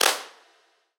Instrument samples > Synths / Electronic
Clap one-shot made in Surge XT, using FM synthesis.